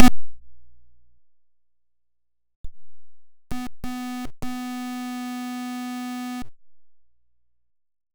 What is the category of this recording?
Sound effects > Electronic / Design